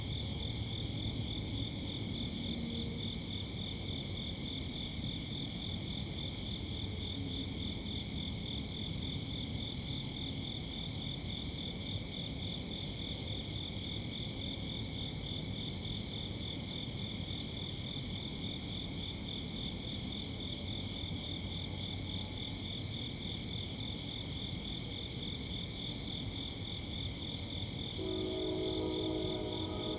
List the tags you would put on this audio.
Soundscapes > Urban
crickets horn night suburbia train Train-horn

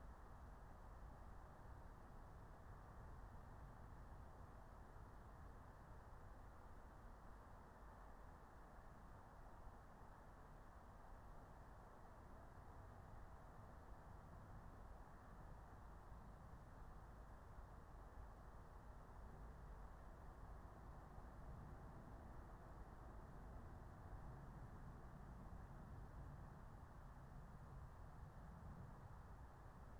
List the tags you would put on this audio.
Soundscapes > Nature
nature natural-soundscape meadow phenological-recording soundscape raspberry-pi field-recording alice-holt-forest

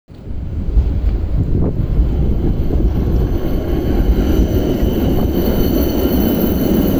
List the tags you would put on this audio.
Sound effects > Vehicles
tram rail

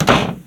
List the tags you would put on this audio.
Sound effects > Objects / House appliances
wooden shut close sauna

